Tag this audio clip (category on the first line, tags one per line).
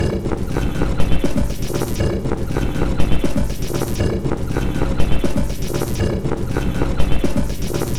Instrument samples > Percussion
Samples
Loop
Industrial
Weird
Dark
Drum
Alien
Ambient
Packs
Loopable
Soundtrack
Underground